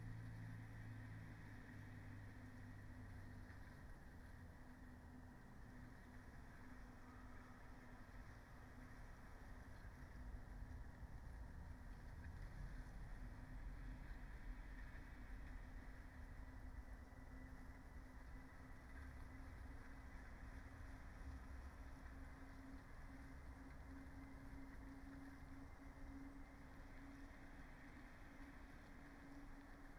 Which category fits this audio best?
Soundscapes > Nature